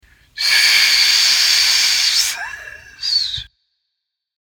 Sound effects > Human sounds and actions
Hissing Sound 02
Hissing sound, exhaling breath. It is an original sound, and I own it. It was not copied from anywhere or from anyone. I used a dynamic microphone for the sound I created. Thanks.
Death, Deathhiss, Exhale, Exhaling